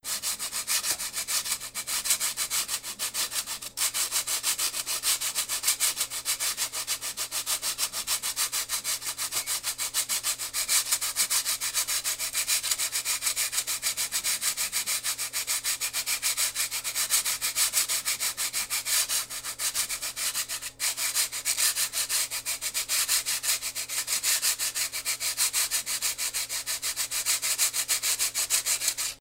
Music > Solo percussion
MUSCPerc-Blue Snowball Microphone, MCU Sand Blocks, Latin Rhythm Nicholas Judy TDC
A latin sand block rhythm.
latin, sand-block